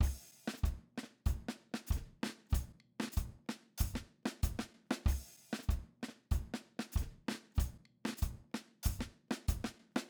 Music > Solo percussion
Short loop 95 BPM in 4
Drum loop sample from recent studio session
drums, kit, live, loop, recording, studio